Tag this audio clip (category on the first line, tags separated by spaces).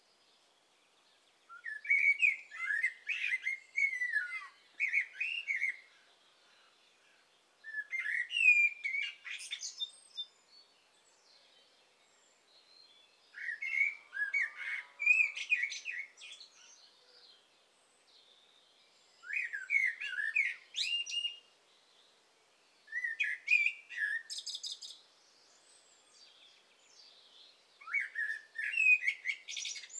Soundscapes > Nature
France Haute-Vienne Birds Blackbird Bird